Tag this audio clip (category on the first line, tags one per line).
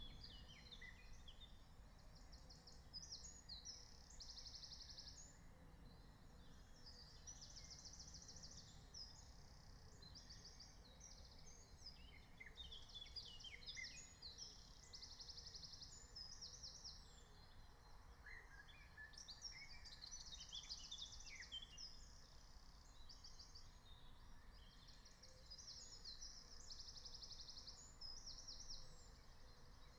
Soundscapes > Nature
alice-holt-forest
field-recording
meadow
nature
raspberry-pi
soundscape